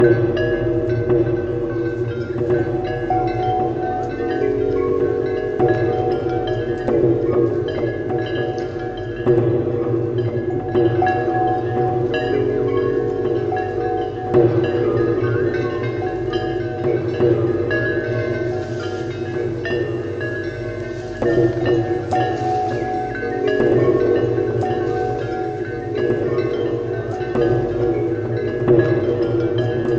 Sound effects > Experimental
Ambient for Japanese forest